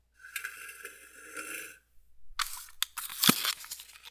Sound effects > Objects / House appliances
up close personal foil wrapper-001
foley perc sfx fx percussion sample field recording
fx, sample, percussion, field, sfx, perc, foley, recording